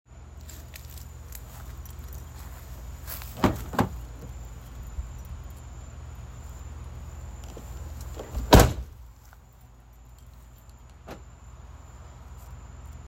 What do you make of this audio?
Sound effects > Vehicles
car open & closed
door, slamming, vehicle